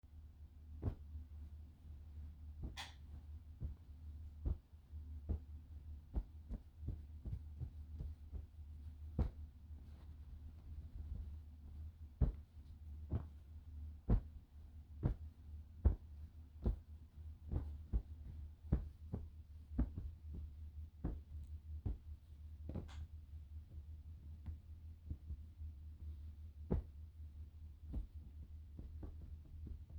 Sound effects > Objects / House appliances
Plushy Plop
The sound of a plushy or pillow hitting another squishy surface.
beans, dropping, pillow